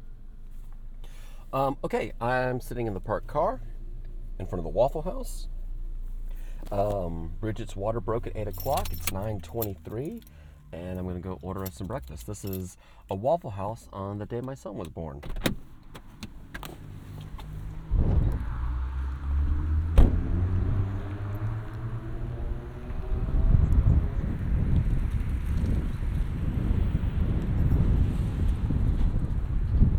Soundscapes > Urban
AMBRest Waffle House Friday Morning, line cook, patrons talking, waitress, food prep QCF Cincinnati Ohio iPhone SE2 with Senheisser Ambeo
My wife's water broke and she wanted waffles before we headed to the hospital ... so I took a microphone with me. Ambiance of a Waffle House on a Friday morning, 08.23.2021